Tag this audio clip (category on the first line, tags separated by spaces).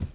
Sound effects > Objects / House appliances
spill pail container metal shake clang bucket pour lid carry clatter slam drop object plastic scoop knock water foley handle debris household tool fill kitchen cleaning tip hollow garden liquid